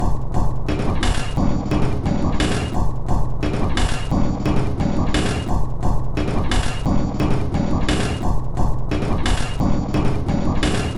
Instrument samples > Percussion
This 175bpm Drum Loop is good for composing Industrial/Electronic/Ambient songs or using as soundtrack to a sci-fi/suspense/horror indie game or short film.
Dark, Drum, Industrial, Loop, Packs, Samples, Soundtrack, Underground